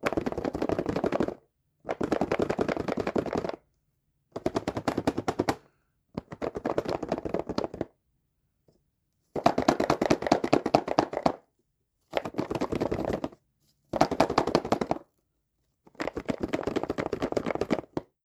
Sound effects > Objects / House appliances
A box with things inside rattling.